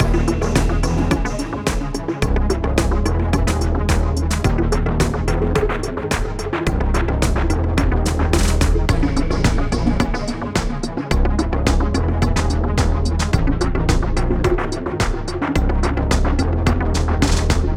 Music > Multiple instruments
chilly subterranean beat
Electro beat with chilly underwater vibes. Features heavily modified samples from PreSonus loop pack included in Studio One 6 Artist Edition
chill, electro, loop, synth